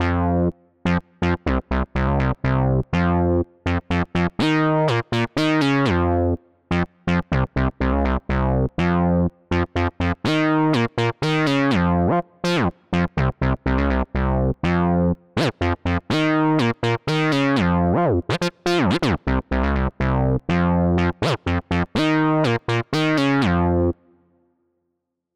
Music > Solo instrument
3-4 Bass line - 123bpm Fm
120bpm-ish, synth, Mini-V4, 3-4